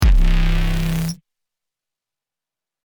Instrument samples > Synths / Electronic
Guillotine Bass LONG
Synth bass made from scratch The long version
Bass, Electronic, Oneshot